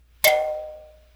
Sound effects > Objects / House appliances
aluminum can foley-020
household, foley, alumminum, tap, fx, metal, sfx